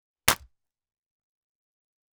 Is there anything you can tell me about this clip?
Sound effects > Objects / House appliances
Stumping soda can crush 1
Subject : Stumping a soda-can flat. They were the tall 33cl cola kind. Date YMD : 2025 July 20 Location : Albi 81000 Tarn Occitanie France. Sennheiser MKE600 P48, no filter. Weather : Processing : Trimmed in Audacity. Notes : Recorded in my basement. Tips : Could maybe be used as a supressed gun shot ( silencer )? Or a empty gun click?
Tascam, Soda, Stump, FR-AV2, stomp, MKE600, empty, compacting, metallic, tall, crushing, fast-crush, Sennheiser, aluminium, 33cl-tall, stepping, metal, Soda-can, 33cl, aluminium-can, fast, Sodacan, Can, crumple, Stumping, flat